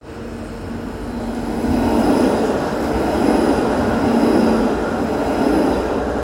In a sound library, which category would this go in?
Sound effects > Vehicles